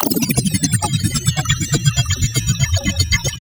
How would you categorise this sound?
Sound effects > Electronic / Design